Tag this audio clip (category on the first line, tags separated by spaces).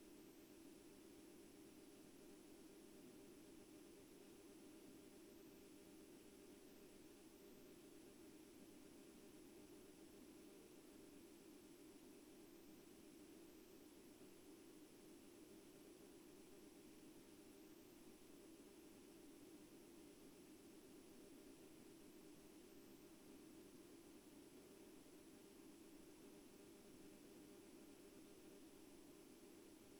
Soundscapes > Nature
weather-data natural-soundscape nature phenological-recording field-recording data-to-sound artistic-intervention alice-holt-forest raspberry-pi soundscape modified-soundscape sound-installation Dendrophone